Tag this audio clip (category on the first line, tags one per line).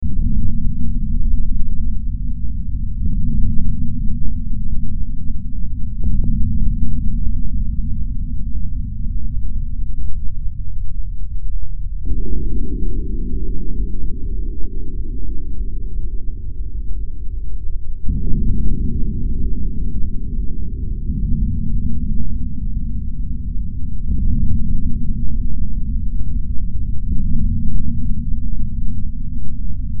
Soundscapes > Synthetic / Artificial
Darkness
Horror
Hill
Ambience
Drone
Sci-fi
Gothic
Noise
Games
Soundtrack